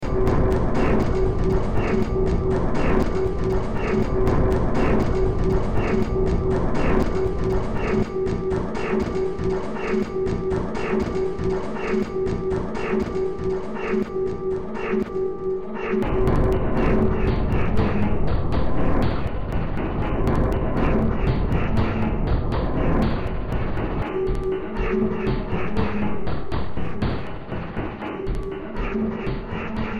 Music > Multiple instruments
Demo Track #3903 (Industraumatic)
Sci-fi, Cyberpunk, Underground, Horror, Ambient, Industrial, Soundtrack, Games, Noise